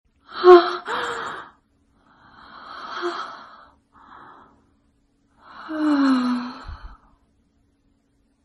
Sound effects > Human sounds and actions
An audio track an actress friend recorded for me. I was working on a video game that got scrapped, but maybe you can use this sound. In this file, a heroine loses her last life, and we hear her gasp in pain, take her final breath, and sigh as the game ends tragically. Enjoy!